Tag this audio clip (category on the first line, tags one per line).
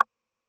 Sound effects > Electronic / Design
game
interface
ui